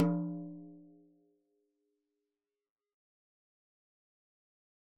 Music > Solo percussion
Hi Tom- Oneshots - 28- 10 inch by 8 inch Sonor Force 3007 Maple Rack
kit, tom, beatloop, toms, beats, fill, hitom, drum, drumkit, instrument, rim, perc, flam, percs, studio, drums, beat, tomdrum, rimshot, percussion, roll, acoustic, velocity, hi-tom, oneshot